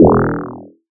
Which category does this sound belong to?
Instrument samples > Synths / Electronic